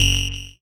Sound effects > Electronic / Design
Analog Bass, Sweeps, and FX-001
analog fx sound created using analog synths with analog delay , processed in Reaper